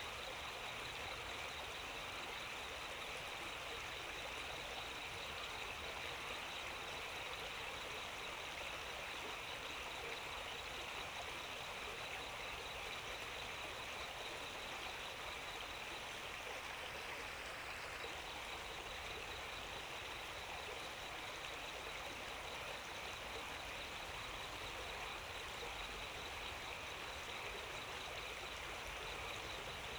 Soundscapes > Nature
Cricket Creek Ambience
Ambient sound of a creek at night in Ojai, CA. Crickets in foreground, frogs in background. Recorded with a shotgun mic + stereo field recorder to create a wide image.
frogs, crickets, nature, ambient